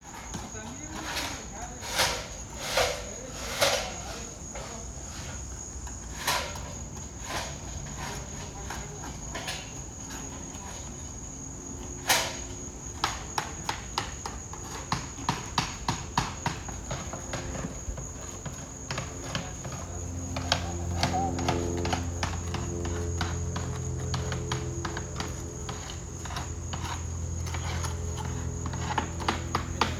Soundscapes > Urban
street construction
how many kerrytown men does it take to mend a wall own sound recorded with iphone 16 pro voice memos app
airplane, cars, cicadas, ladders, or-crickets